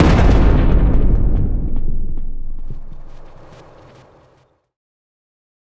Sound effects > Other
Sound Design Elements Impact SFX PS 049
power, blunt, heavy, impact, sharp, design, strike, rumble, smash, force, game, collision, hard, percussive, shockwave, sfx, effects, cinematic, thudbang, sound, explosion, crash, audio, transient, hit